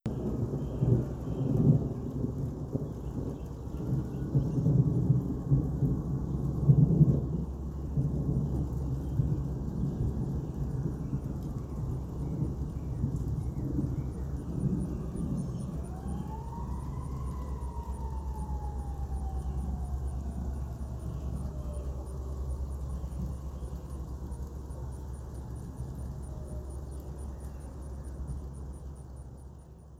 Sound effects > Natural elements and explosions
THUN-Samsung Galaxy Smartphone, CU Thunder, Rumbles, Distant Fire Truck Siren Nicholas Judy TDC
Thunder rumbles with fire truck siren wailing in distance.
fire-truck, rumble, siren